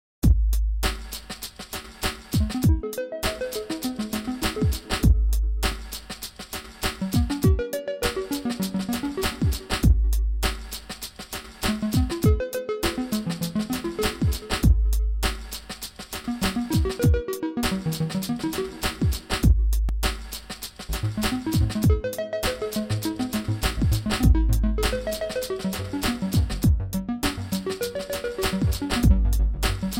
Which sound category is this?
Music > Multiple instruments